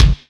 Instrument samples > Percussion
This file is a tom trigger.